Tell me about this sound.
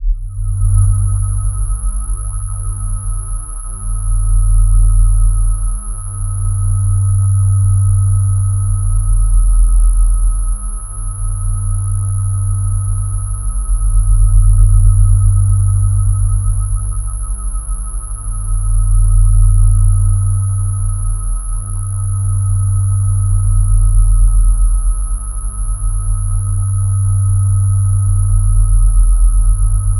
Sound effects > Electronic / Design
Deep spaceship engine created with synth pad in Cakewalk.
sci-fi, space, spaceship